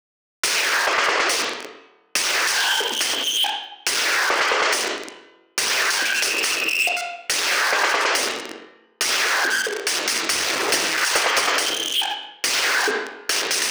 Music > Solo percussion
Weird Drums v3
Blown out drum loop made using ZynAddSubFX with multiple layers of distortion, decimation, and a wah wah. This is an isolated version of one section of the drum track
hard, drum-loop, industrial, distortion, percussion-loop, 140-bpm